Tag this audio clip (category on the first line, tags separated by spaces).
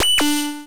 Sound effects > Electronic / Design
alert button digital interface menu notification options UI